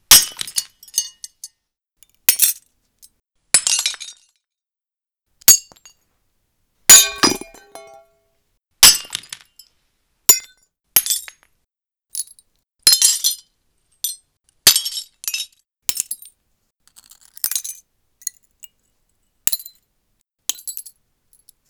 Sound effects > Experimental
A quick foley session with the remains of a broken filament LED bulb which decided to yeet itself off a shelf and shatter on the floor. I decided, before vacuuming up the mess, to take the opportunity to record some glass shattering foley. This was done by dropping shards onto the floor, dropping a screwdriver onto the pile, and also crushing shards with pliers. Protection was taken as well as thorough cleaning to ensure no shards remained. No one else was allowed in the room when I did this. Note that these recordings have been modified in Audacity. Some sounds are layered and mixed together, others are pitch shifted. This is for dramatic effect, as I'm also experimenting with sound design. Recorded using a Razer Seiren Mini 3 mic and Audacity 3.7.6 (Linux).